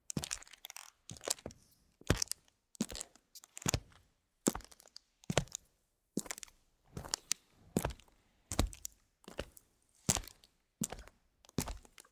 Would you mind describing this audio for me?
Sound effects > Human sounds and actions
FEETHmn Squeaky Wooden Parquet Footsteps
Human footsteps on old wooden parquet flooring. The floorboards creak and squeak with each step, producing a natural, slightly tense ambience.
realism, creaky, parquet, slow, footsteps, interior, old, tension, human, wood, floor, horror, squeaky